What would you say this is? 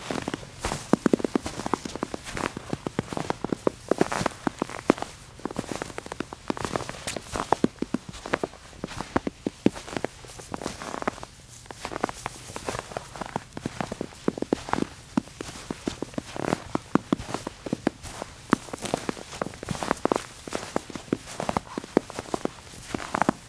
Sound effects > Human sounds and actions
Walking in fresh snow Recorded with Zoom H5 Studio and FEL Clippy microphone
foot, step